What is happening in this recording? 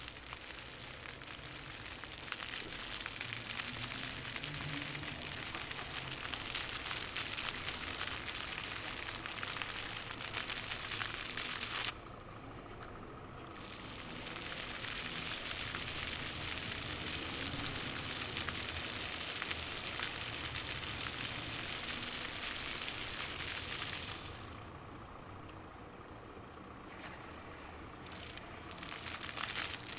Soundscapes > Urban
Little Fountain
Random recording from 2015, one of my first recordings made with then new Tascam DR-05. Sound of a city fountains in Gdynia with street noises in the background.
splash, fountain, water